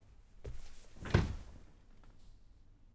Sound effects > Objects / House appliances
Man falls on old sofa. Recorded with M-Audio M-TRACK II and pair of Soyuz 013 FET mics.